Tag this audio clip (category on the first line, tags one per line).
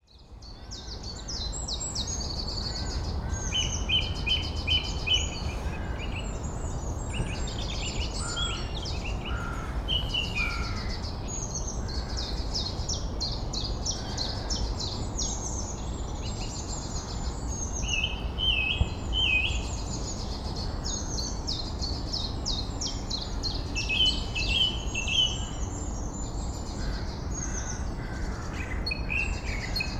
Soundscapes > Nature
ambience
birds
field
nature
recording